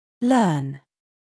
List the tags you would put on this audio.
Speech > Solo speech
pronunciation english word

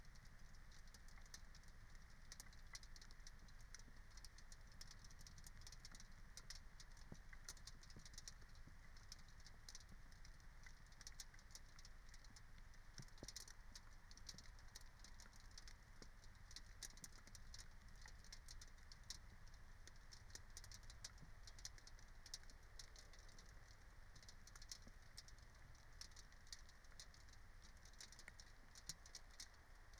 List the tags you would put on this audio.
Soundscapes > Nature
raspberry-pi phenological-recording field-recording alice-holt-forest soundscape nature meadow natural-soundscape

